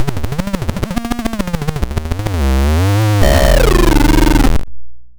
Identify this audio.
Sound effects > Electronic / Design
Optical Theremin 6 Osc dry-050
Alien, Bass, Experimental, Instrument, Robot, Scifi, Spacey, Sweep